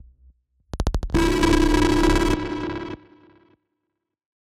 Sound effects > Electronic / Design
Optical Theremin 6 Osc Shaper Infiltrated-027
Alien, Analog, Chaotic, Crazy, DIY, EDM, Electro, Electronic, Experimental, FX, Gliltch, IDM, Impulse, Loopable, Machine, Mechanical, Noise, Oscillator, Otherworldly, Pulse, Robot, Robotic, Saw, SFX, strange, Synth, Theremin, Tone, Weird